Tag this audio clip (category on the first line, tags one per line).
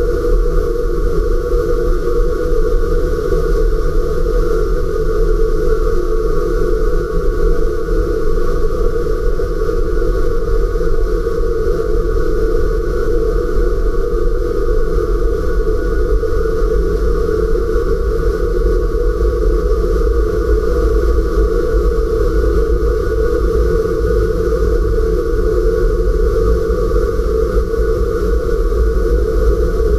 Sound effects > Experimental
singing
static
sad